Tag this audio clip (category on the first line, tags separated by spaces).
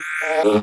Sound effects > Electronic / Design
UI menu interface Digital notification options alert